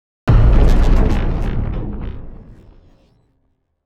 Sound effects > Other
Sound Design Elements Impact SFX PS 096
A powerful and cinematic sound design impact, perfect for trailers, transitions, and dramatic moments. Effects recorded from the field.
audio, blunt, cinematic, crash, design, effects, explosion, heavy, hit, impact, percussive, power, sfx, strike